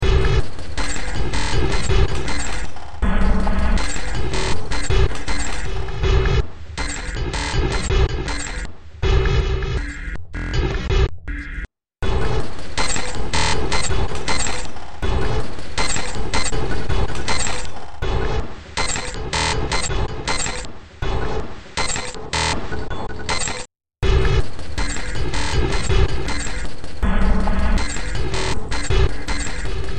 Music > Multiple instruments
Short Track #3168 (Industraumatic)
Underground, Sci-fi, Soundtrack, Games, Noise, Industrial, Cyberpunk, Ambient, Horror